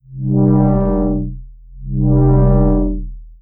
Sound effects > Electronic / Design
Potent energy pulsates regularly, its volume oscillating in the shape of a top-half sine wave.
electromagnetic, electronic, heartbeat, machine, pulse, throb